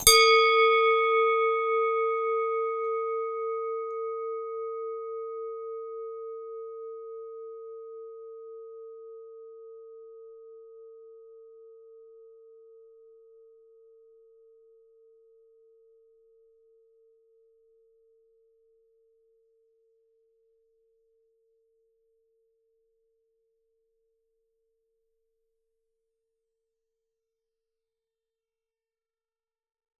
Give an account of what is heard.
Instrument samples > Percussion
Swiss cowbell 14Wx11Hcm - Under mic 2
Subject : A cowbell (actual bell not the instrument) 14cm large by 11cm high. Recorded with the microphone under the bell pointing up towards the rim. Date YMD : 2025 04 21 Location : Gergueil France. Hardware : Tascam FR-AV2 Rode NT5 microphones. Weather : Processing : Trimmed and Normalized in Audacity. Probably some Fade in/outs too.